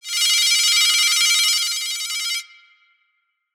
Sound effects > Electronic / Design
phone2 (cyberpunk ambience)
80s, ambience, cyberpunk, electronic, retro, sfx, synth, synthwave, techno